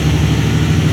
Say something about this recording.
Sound effects > Vehicles
MAN/Solaris bus engine revving high before shifting into second. The reason the sample is so short is because it's not too often that you hear an automatic gearbox allow an engine to rev this high (probably redline for the bus).
bus, drive, rev